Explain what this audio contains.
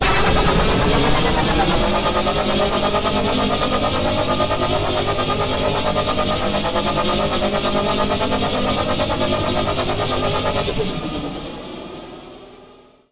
Sound effects > Electronic / Design
sci-fi Engine
A synthetic Engine Sounds, The turn on & off are also designed, made with Pigments via Studio One
Synthetic, future, sci-fi, science-fiction, digital